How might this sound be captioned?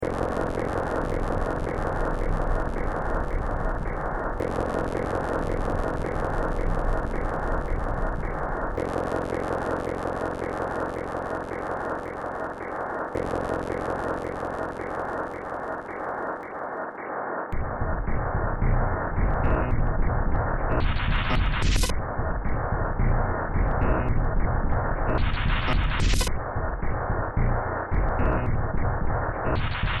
Music > Multiple instruments
Demo Track #3602 (Industraumatic)
Cyberpunk, Horror, Industrial, Noise, Sci-fi